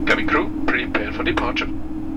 Soundscapes > Indoors
Cabin crew prepare for departure
The announcement made from the captain to the cabin crew to prepare for departure right before taking off. Recorded with Tascam Portacapture X6
flight, field-recording, announcement, crew, take-off, cabin, airplane, departure, airport